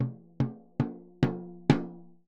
Music > Solo percussion
Med-low Tom - Oneshot 44 12 inch Sonor Force 3007 Maple Rack
drum, recording, loop, beat, wood, Medium-Tom, Tom, real, oneshot, roll, perc, drums, acoustic, tomdrum, flam, toms, quality